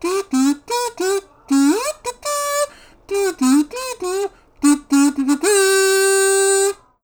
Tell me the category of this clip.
Music > Solo instrument